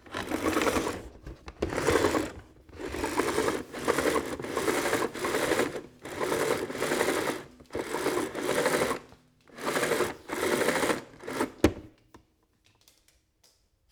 Sound effects > Objects / House appliances
250726 - Vacuum cleaner - Philips PowerPro 7000 series - Pulling power cable
7000, aspirateur, cleaner, FR-AV2, Hypercardioid, MKE-600, MKE600, Powerpro, Powerpro-7000-series, Sennheiser, Shotgun-mic, Shotgun-microphone, Single-mic-mono, Tascam, Vacum, vacuum, vacuum-cleaner